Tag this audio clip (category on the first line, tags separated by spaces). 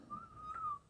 Sound effects > Animals
australia bird emu outback ratite